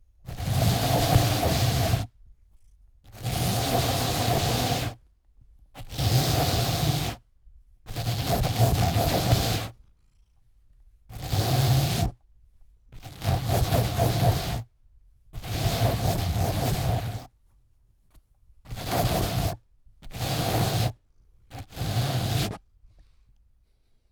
Sound effects > Objects / House appliances
Cat brush brushing sofa - MS RAW
Recorded 2025 05 14 for Dare 2025-08 on the theme of friction specifically on fabrics. When my room-mate cleaned the couch from all the cat hair, by using the cat brush, I figured it might be a first recording of such an event, so I remade it. The couch's cover is a thick and tight fabric, the brush is plastic with metal brushes. I clamped my zoom h2n on the brush pointing towards it. Set in MS raw mode. So left is mid, right is side. To be converted to regular stereo or mono. It drew the cat's attention, wanting to be brushed, so you might hear her purring and bumping into the setup.
brush, brushing, cat-brush, Dare2025-08, fabric, Friction, H2n, MS, MS-RAW, RAW